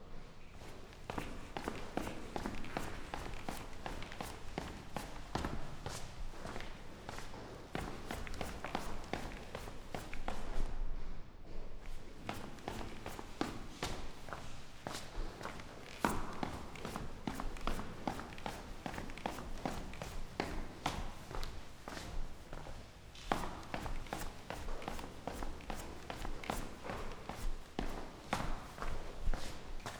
Sound effects > Human sounds and actions
FEETHmn Walking Down Footsteps Stone Staircase Sneaker old building Vienna
Me walking down a stone staircase recorded by myself with Røde NTG5 + Zoom F3
Footsteps, Staircase, Stairs, Stone, Walk, Walking